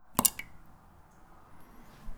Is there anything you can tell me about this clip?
Sound effects > Other mechanisms, engines, machines
Woodshop Foley-054
thud, fx, oneshot, bang, percussion, bop, pop, perc, tink, little, knock, sound, strike, crackle, wood, tools, rustle, boom, foley, shop, metal, sfx, bam